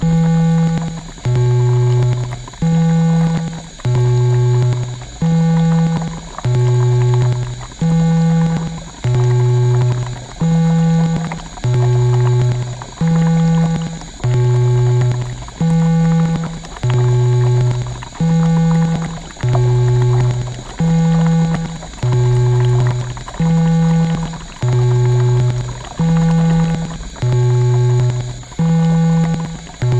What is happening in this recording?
Sound effects > Electronic / Design
SCIRetro-CU Electronic Laboratory Experiment Nicholas Judy TDC
An electronic laboratory experiment. The slow beep element was created using Femur Design's Theremin app.